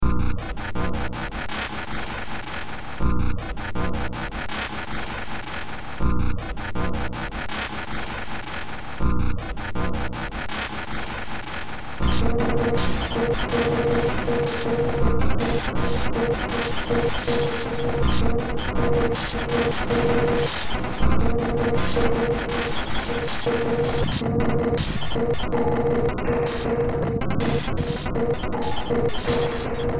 Music > Multiple instruments
Demo Track #3272 (Industraumatic)
Cyberpunk, Industrial, Sci-fi, Underground, Ambient, Games, Horror, Soundtrack, Noise